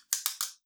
Instrument samples > Percussion
Cellotape Percussion One Shot4
glitch, organic, experimental, shot, creative, DIY, sample, unique, lo-fi, cinematic, percussion, foley, IDM, tape, adhesive, pack, ambient, found, samples, texture, cellotape, one, sound, shots, design, drum, layering, electronic, sounds